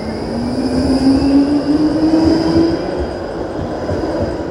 Sound effects > Vehicles
Tram arrival and departure sequences including door chimes and wheel squeal. Wet city acoustics with light rain and passing cars. Recorded at Sammonaukio (19:00-20:00) using iPhone 15 Pro onboard mics. No post-processing applied.
city, urban, Tram